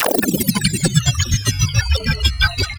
Sound effects > Electronic / Design
FX-Downlifter-Glitch Downlifter 2
Downlifter, Downsweep, Drop, Effect, FX, Glitch